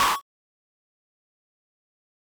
Instrument samples > Percussion
a distorted 909 clap
drums, clap, percussion